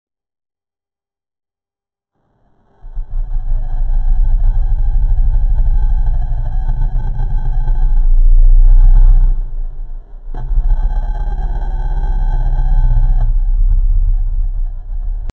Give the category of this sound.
Soundscapes > Synthetic / Artificial